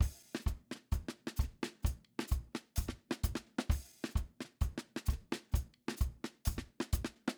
Music > Solo percussion
Short pitched loop 130 BPM in 4
live, recording, studio, drums, kit